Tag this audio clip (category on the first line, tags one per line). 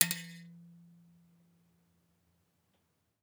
Sound effects > Other mechanisms, engines, machines
garage
sample